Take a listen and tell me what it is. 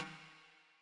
Music > Solo percussion
Snare Processed - Oneshot 127 - 14 by 6.5 inch Brass Ludwig
acoustic, brass, drum, perc, percussion, processed, realdrums, rimshot, rimshots, roll, snare